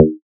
Instrument samples > Synths / Electronic
FATPLUCK 4 Eb
additive-synthesis, bass, fm-synthesis